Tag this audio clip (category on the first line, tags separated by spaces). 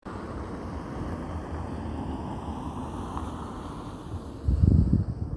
Sound effects > Vehicles
car engine vehicle